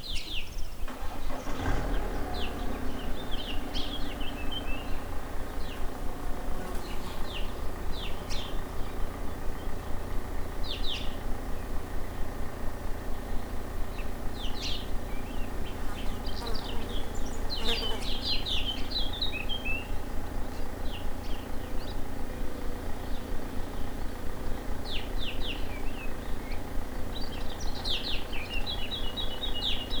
Sound effects > Vehicles
250427-15h12 Gergueil Car startup and passby
Subject : A car staring up then drying by Date YMD : 2025 04 27 15h12 Location : Gergueil France. Hardware : Zoom H5 stock XY capsule. Weather : Processing : Trimmed and Normalized in Audacity.